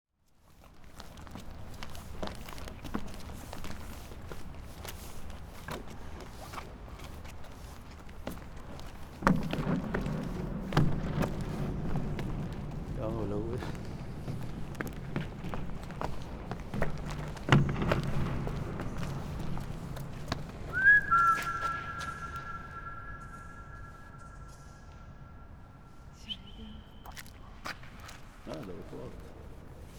Soundscapes > Indoors
Inside Niemeyer's Dome (Tripoli - Lebanon)
Recorded in Tripoli (Lebanon). 2016. Sony PCM D50 <3
ambient, creepy, experimental, Niemeyer, voice, weird